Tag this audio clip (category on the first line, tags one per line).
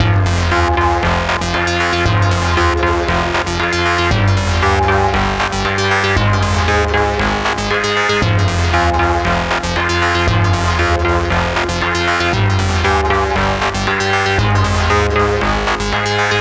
Music > Solo instrument
80s
Analog
Analogue
Brute
Casio
Electronic
Loop
Melody
Polivoks
Soviet
Synth
Texture
Vintage